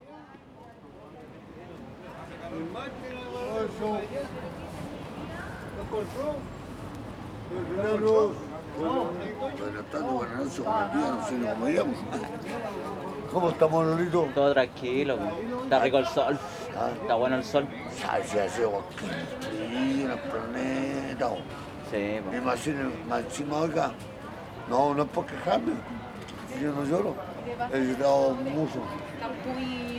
Speech > Conversation / Crowd
Conversacion borracho valparaiso
Conversation with a local drunkard at a dining hall.